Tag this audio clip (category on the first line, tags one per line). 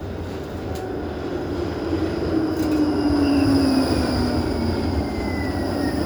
Sound effects > Vehicles
Vehicle
Tram